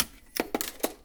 Sound effects > Other mechanisms, engines, machines
metal shop foley -176
oneshot, metal, crackle, little, thud, strike, bang, percussion, sound, tools, sfx, rustle, boom, shop, perc, fx, bop, wood, knock, foley, bam, tink, pop